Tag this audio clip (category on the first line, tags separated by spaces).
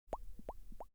Sound effects > Other
bubbles cross door opening